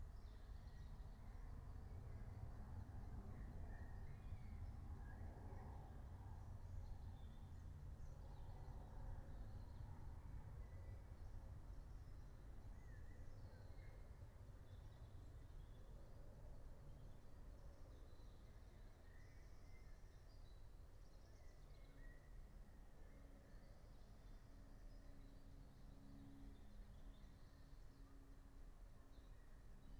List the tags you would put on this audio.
Soundscapes > Nature
nature soundscape field-recording natural-soundscape phenological-recording meadow raspberry-pi alice-holt-forest

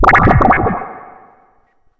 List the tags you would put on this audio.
Instrument samples > Synths / Electronic
SYNTH MODULAR BENJOLIN 1SHOT DRUM

